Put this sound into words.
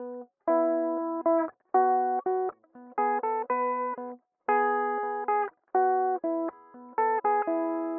Music > Solo instrument
A simple guitar riff
electric, guitar, riff